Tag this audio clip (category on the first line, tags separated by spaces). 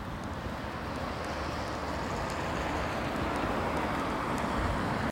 Soundscapes > Urban
car tampere vehicle